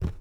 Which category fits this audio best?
Sound effects > Objects / House appliances